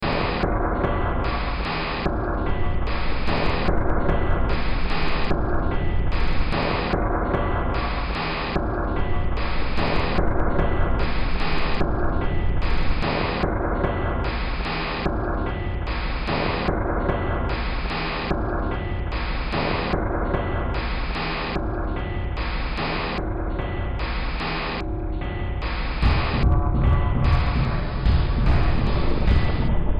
Music > Multiple instruments
Demo Track #2994 (Industraumatic)
Horror, Soundtrack, Underground, Noise, Ambient, Games, Cyberpunk, Sci-fi, Industrial